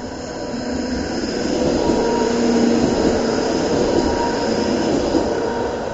Soundscapes > Urban
Passing Tram 11
field-recording, outside, street, traffic, tram, trolley